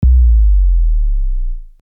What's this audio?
Instrument samples > Other
Ableton Live. VST.Serum......Fx boom Free Music Slap House Dance EDM Loop Electro Clap Drums Kick Drum Snare Bass Dance Club Psytrance Drumroll Trance Sample .
Dance, Drum, boom, Loop, Clap, Bass, Fx, EDM, Snare, Drums, Kick, Electro, Slap, Free, Music, House